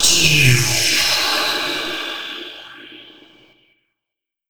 Sound effects > Electronic / Design
Impact Sweep
This is a sweep sound I made.
bang, Drumkit, Drums, Drum, Impact, Sweeper, Music, EDM, Sweep, Loop